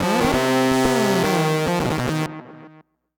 Sound effects > Experimental
Analog Bass, Sweeps, and FX-137
bass, mechanical, trippy, effect, robotic, sample, synth, machine, pad, sweep, sfx, snythesizer, alien, electronic, electro, dark, oneshot, vintage, retro, basses, bassy, sci-fi, korg, analogue, complex, analog, scifi, weird, robot